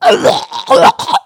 Sound effects > Human sounds and actions
Hurt sounds 1
Human, Hurt, Scream, Strange